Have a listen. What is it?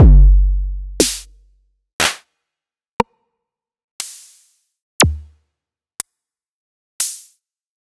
Instrument samples > Percussion
Lucia Drum Kit #005
drum, kick, hihat, woodblock, kit, snare, cowbell, thwack, rimshot, synth, percussion, tom